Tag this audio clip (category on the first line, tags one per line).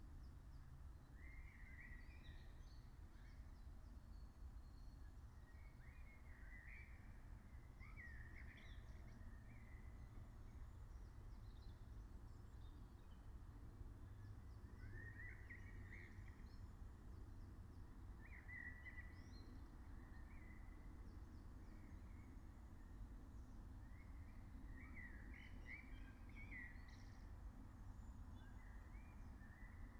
Soundscapes > Nature
alice-holt-forest
natural-soundscape
phenological-recording